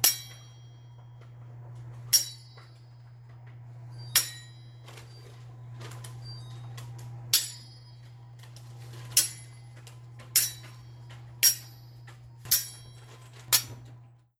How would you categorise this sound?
Sound effects > Objects / House appliances